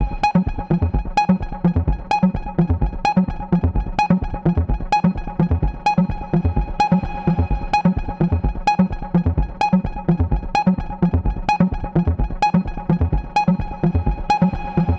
Instrument samples > Synths / Electronic
Techno Synth Arp 001
synth, techno, arp